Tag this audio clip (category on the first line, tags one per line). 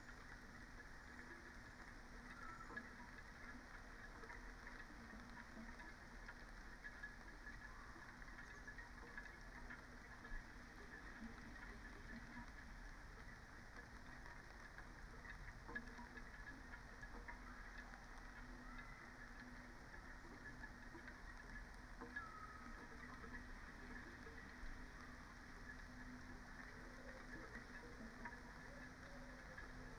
Soundscapes > Nature
artistic-intervention
Dendrophone
data-to-sound
nature
raspberry-pi
natural-soundscape
weather-data
phenological-recording
sound-installation
soundscape
alice-holt-forest
field-recording
modified-soundscape